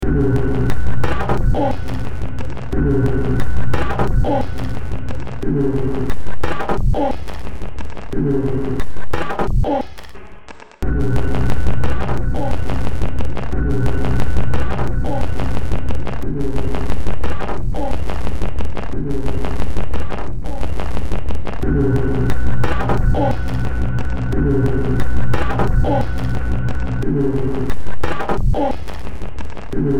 Music > Multiple instruments
Short Track #3148 (Industraumatic)
Ambient, Cyberpunk, Games, Horror, Industrial, Noise, Sci-fi, Soundtrack, Underground